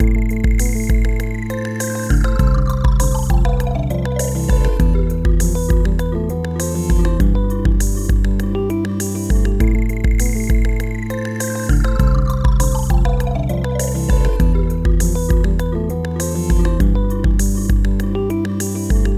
Music > Multiple instruments
Hazy Prospects
This looped melodic sample is suitable as an atmospheric background for projects of various lengths.
100BPM, bells, electronic, gumball, loop, melodic, smooth